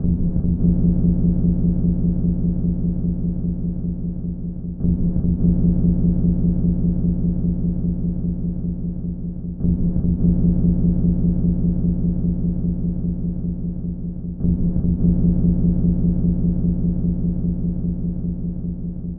Soundscapes > Synthetic / Artificial
This 100bpm Ambient Loop is good for composing Industrial/Electronic/Ambient songs or using as soundtrack to a sci-fi/suspense/horror indie game or short film.
Dark, Industrial, Weird, Packs, Drum, Soundtrack, Alien, Samples, Loopable, Ambient, Underground, Loop